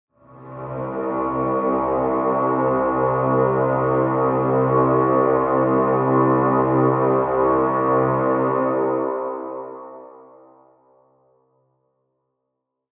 Sound effects > Other
horn sound
a very deep sound like a horn
deep, horn